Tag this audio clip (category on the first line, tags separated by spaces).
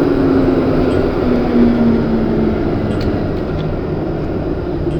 Sound effects > Vehicles
tramway vehicle